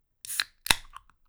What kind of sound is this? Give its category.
Soundscapes > Other